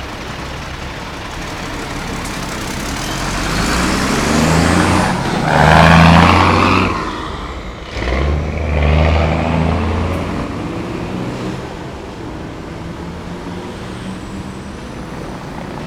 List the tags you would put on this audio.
Sound effects > Vehicles
city field-recording engine noise traffic

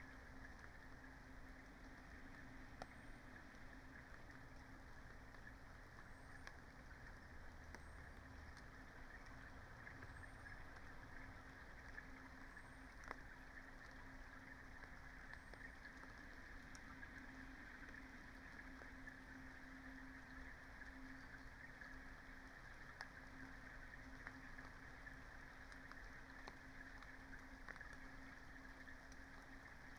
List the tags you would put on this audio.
Soundscapes > Nature
soundscape raspberry-pi modified-soundscape sound-installation field-recording alice-holt-forest data-to-sound Dendrophone phenological-recording artistic-intervention nature natural-soundscape weather-data

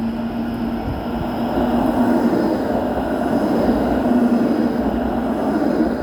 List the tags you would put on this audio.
Sound effects > Vehicles
moderate-speed Tampere tram embedded-track passing-by

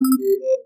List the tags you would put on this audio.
Sound effects > Electronic / Design
alert button Digital Interface menu message notification options UI

